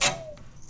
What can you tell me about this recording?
Sound effects > Other mechanisms, engines, machines
Handsaw Pitched Tone Twang Metal Foley 27
smack, foley